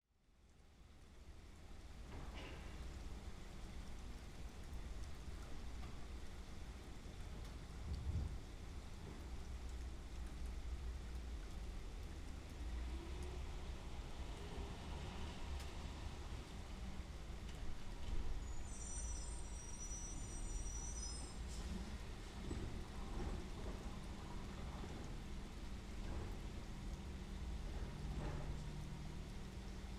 Soundscapes > Urban
Garbage Truck in the Rain
The stereo sound of a garbage truck making its way down the street that takes place every other Thursday at 11:30am here in the rainy PNW. Captured on Zoom F3 with SO.1 omni mics outside of my kitchen window onto the alley.